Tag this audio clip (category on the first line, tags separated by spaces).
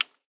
Sound effects > Human sounds and actions
button off toggle activation click interface switch